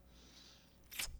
Sound effects > Human sounds and actions
sound of me kissing the top of my hand, recorded on a sm57
kissing, lips